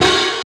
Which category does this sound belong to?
Instrument samples > Percussion